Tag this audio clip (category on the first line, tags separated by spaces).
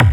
Instrument samples > Percussion
1lovewav 80s distorted kick